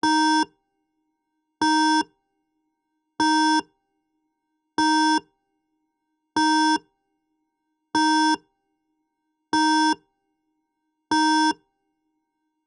Sound effects > Electronic / Design
Synthed with phaseplant only.
Phone, Counting, telephone, Nuclear-boom, Countdown